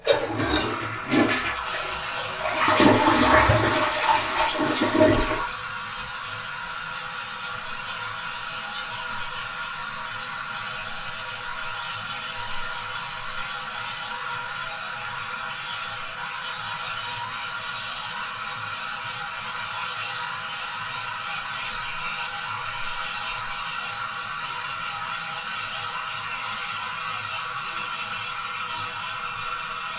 Sound effects > Objects / House appliances
WATRPlmb-Samsung Galaxy Smartphone, CU Toilet Flush, Air Tank Fill 01 Nicholas Judy TDC
A toilet flushing.
air-tank, fill